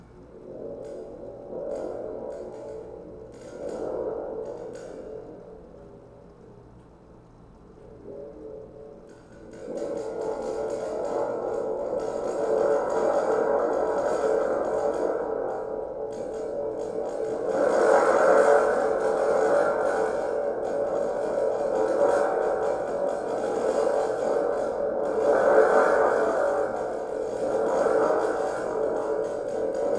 Music > Solo percussion
MUSCPerc-Blue Snowball Microphone, CU Thunder Tube, Rumble, Long Nicholas Judy TDC
A long thunder tube rumble.
cartoon, rumble, Blue-brand, theatrical, Blue-Snowball, long, thunder-tube